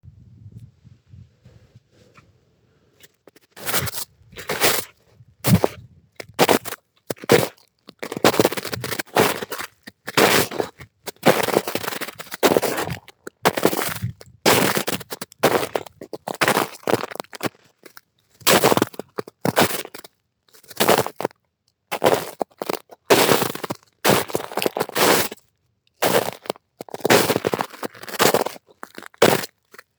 Sound effects > Human sounds and actions
Footsteps in snow. First part is recorded in deeper snow, second part is thinner, frosty snow. The recording contains continuous walking, running, slow individual steps (easier to cut), and also jumping/landing in snow. A few stumbles, slides are also recorded.
footsteps, running, snow, walking